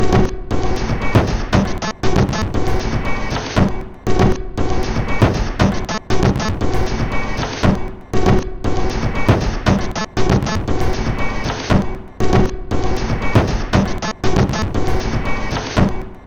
Instrument samples > Percussion
This 118bpm Drum Loop is good for composing Industrial/Electronic/Ambient songs or using as soundtrack to a sci-fi/suspense/horror indie game or short film.
Dark, Underground, Packs, Loop, Weird, Industrial, Soundtrack, Samples, Loopable, Alien, Drum, Ambient